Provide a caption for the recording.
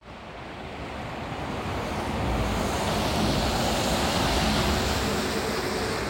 Soundscapes > Urban
Bus driving by recorded on an iPhone in an urban area.